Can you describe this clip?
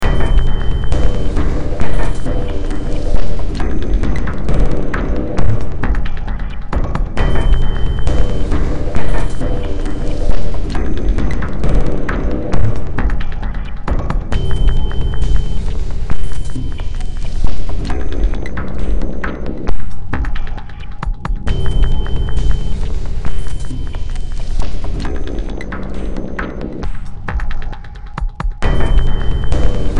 Music > Multiple instruments
Demo Track #3060 (Industraumatic)
Ambient, Cyberpunk, Games, Horror, Industrial, Noise, Sci-fi, Soundtrack, Underground